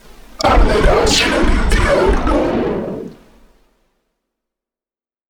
Speech > Solo speech
Arderas en el infierno - You will burn in hell In a demon voice for video game, sampling... My voice with a SM57 and distortion plugins (FL DAW)